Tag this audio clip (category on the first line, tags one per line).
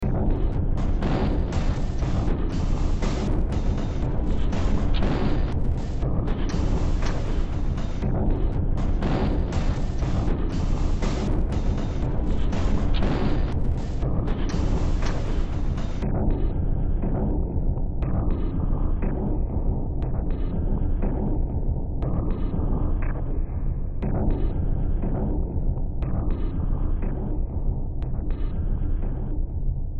Music > Multiple instruments
Noise
Sci-fi
Soundtrack
Games
Underground
Horror
Cyberpunk
Ambient
Industrial